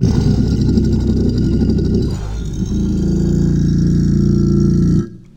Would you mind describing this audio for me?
Sound effects > Experimental
From a collection of creature and monster alien sfx fx created by my throat singing in my studio and processing with a myriad of vsts effects in Reaper, including infiltrator, fabfilter reverb, shaperbox, and others